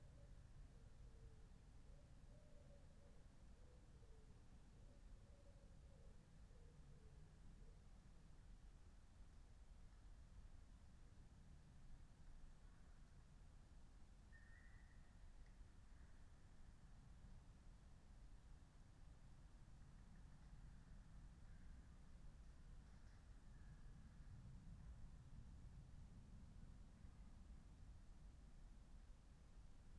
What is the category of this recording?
Soundscapes > Nature